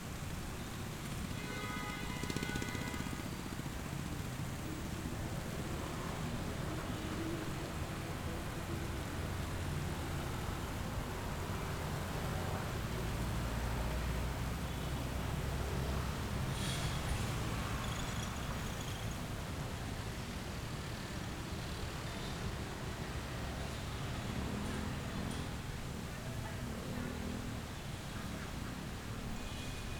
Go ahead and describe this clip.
Soundscapes > Urban
Binondo, Manila Philippines
The oldest Chinatown outside China hums with the rhythm of woks, bargaining voices, and footsteps weaving through tight alleys. Filipino and Chinese cultures blend in a sensory symphony of food and trade. Specific sounds you can hear: people chatter, bargaining voices, and vehicle sounds, and more.
Binondo, Field-Recording, Filipino-Chinese, Jeepneys, Manila, Market, People, Street, Urban, Vehicles